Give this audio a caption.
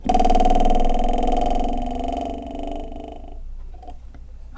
Sound effects > Objects / House appliances
Metal Beam Knife Plank Vibration Wobble SFX 3
SFX, Foley, Klang, Wobble, Beam, Trippy, Vibration, Perc, Metal, metallic, Clang, ting, Vibrate, FX, ding